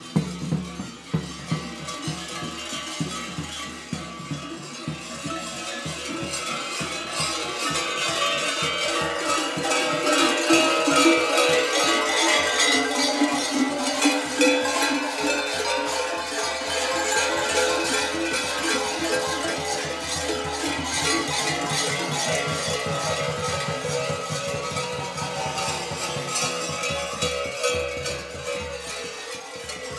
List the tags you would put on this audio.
Soundscapes > Other
pernik
traditional
dance
bulgarian

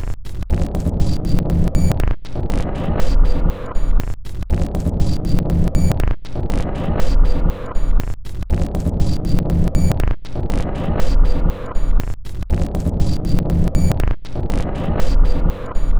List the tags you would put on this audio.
Instrument samples > Percussion
Ambient
Drum
Industrial
Samples
Soundtrack

